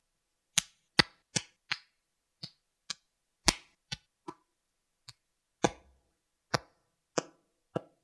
Sound effects > Human sounds and actions
Clap Yo Hands
Hand clap recording